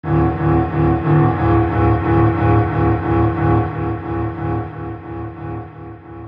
Music > Other
Unpiano Sounds 006
Distorted, Piano